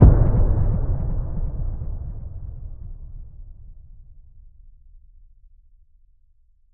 Sound effects > Electronic / Design
ELECTRIC LONG BOOMY PUNCH
BASSY, BOOM, CINEMATIC, DEEP, EDITING, EXPLOSION, GRAND, HIT, HUGE, IMPACT, LOW, MOVIE, RATTLING, RUMBLING